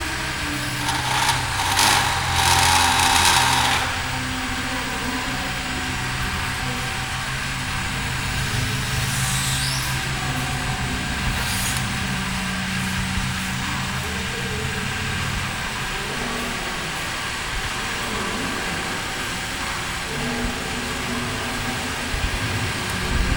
Soundscapes > Urban
On the Battello Boat while Approaching - Biennale Exhibition Venice 2025
On the boat from the train station to the exhibition. The boat is a classic Venice bus boat called "battello". The Battello is approaching to let people go out. Sound recorded while visiting Biennale Exhibition in Venice in 2025 Audio Recorder: Zoom H1essential